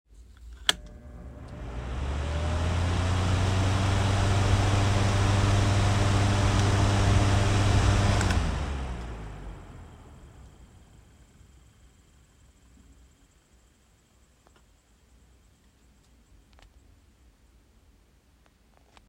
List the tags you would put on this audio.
Sound effects > Objects / House appliances
air-conditioning fan motor

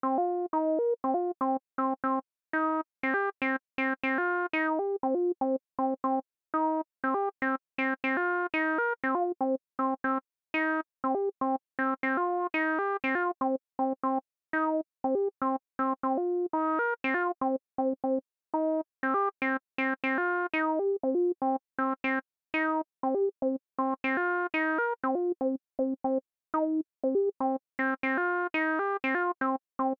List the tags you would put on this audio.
Music > Solo instrument
303 Acid electronic hardware house Recording Roland synth TB-03 techno

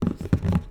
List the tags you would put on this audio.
Sound effects > Objects / House appliances
carry cleaning shake

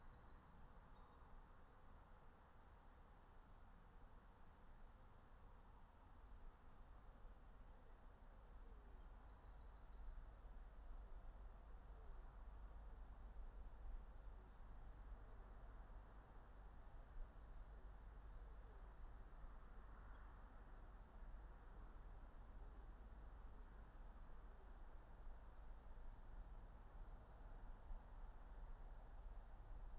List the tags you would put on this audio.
Soundscapes > Nature
field-recording phenological-recording nature meadow soundscape alice-holt-forest raspberry-pi natural-soundscape

